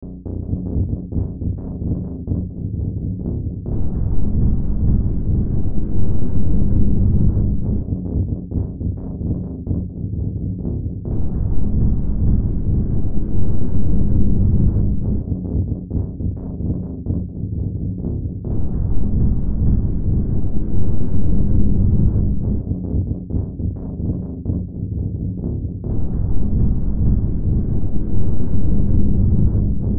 Soundscapes > Synthetic / Artificial
Use this as background to some creepy or horror content.